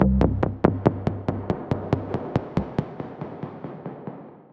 Instrument samples > Synths / Electronic
CVLT BASS 94
synthbass, lowend, lfo, clear, wavetable, wobble, synth, sub, low, subbass, stabs, drops, subs, bassdrop, subwoofer, bass